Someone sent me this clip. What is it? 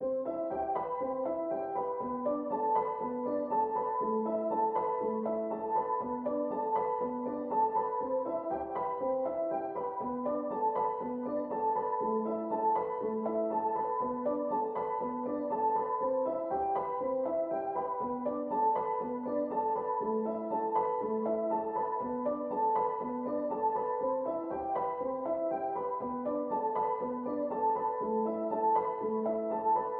Music > Solo instrument
Piano loops 192 efect octave long loop 120 bpm
120bpm, samples, simple, 120, free, music, pianomusic, simplesamples, loop, reverb, piano